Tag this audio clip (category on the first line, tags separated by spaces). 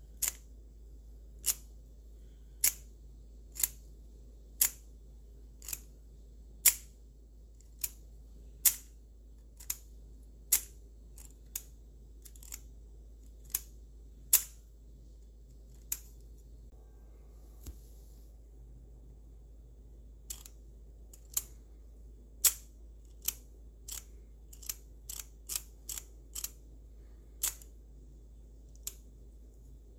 Sound effects > Objects / House appliances
scoop ice-cream-scooper foley Phone-recording